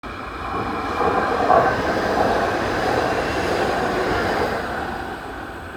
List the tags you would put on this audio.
Soundscapes > Urban
railway field-recording Tram

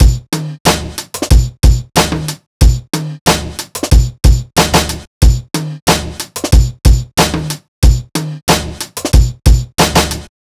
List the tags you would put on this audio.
Music > Other
break drumbeat drumloop drums hiphop loop rap tip

